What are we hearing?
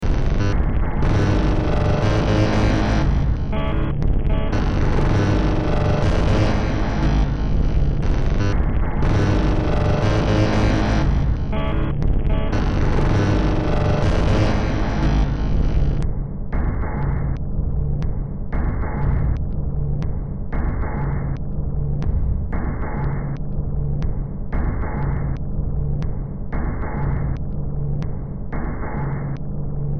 Music > Multiple instruments
Demo Track #4057 (Industraumatic)
Industrial, Sci-fi, Soundtrack, Noise, Horror, Ambient, Cyberpunk, Games, Underground